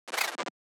Sound effects > Electronic / Design
Glitch (Faulty Core) 6
machine-glitch, glitch-sound-effect, audio-glitch-sound-effect, computer-glitch-sound-effect, audio-glitch, ui-glitch-sound, error-fx, error-sound-effect, machine-glitch-sound, computer-glitch, computer-glitch-sound, ui-glitch-sound-effect, computer-error-sound, audio-glitch-sound, machine-glitching, computer-error, glitch-sound, ui-glitch, glitches-in-me-britches